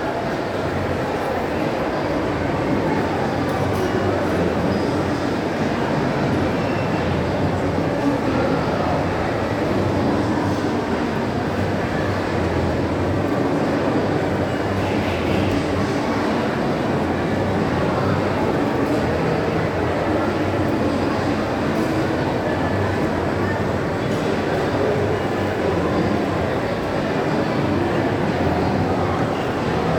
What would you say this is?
Sound effects > Human sounds and actions
Westfield Plaza Bonita Ambience 1
Recorded with Samsung S23 FE at the Westfield Plaza Bonita in April 19th 2025.